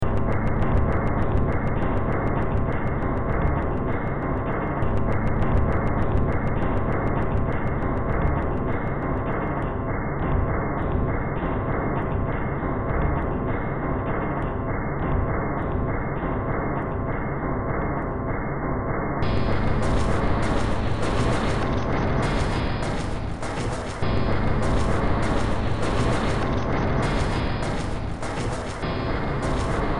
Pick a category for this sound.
Music > Multiple instruments